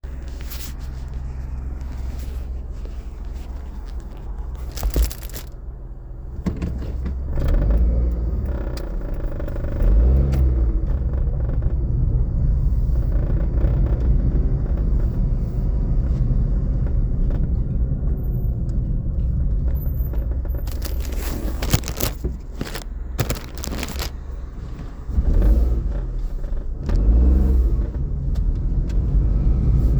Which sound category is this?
Soundscapes > Other